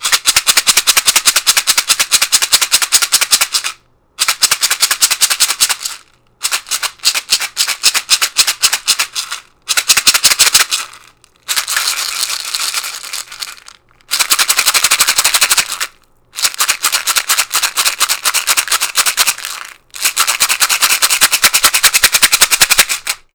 Sound effects > Objects / House appliances
TOONShake-Blue Snowball Microphone, CU Tic Tac Container, Full of Tic Tacs Nicholas Judy TDC
A Tic Tac container shaking. Full of Tic Tacs.
shake, Blue-brand, tic-tac, container, Blue-Snowball, cartoon, full